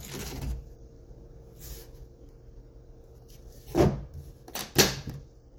Sound effects > Objects / House appliances
OBJCont-Samsung Galaxy Smartphone, CU Toolbox, Unlatch, Open, Close, Latch Nicholas Judy TDC
A toolbox unlatch, open, close and latch.
open, latch, Phone-recording, foley, unlatch, toolbox, close